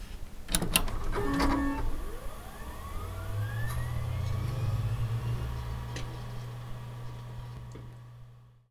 Sound effects > Objects / House appliances
PC startup
Turning on a PC. Significantly shortened (with fade out) from the original source.
pc, startup